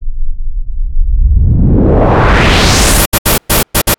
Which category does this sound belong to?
Sound effects > Electronic / Design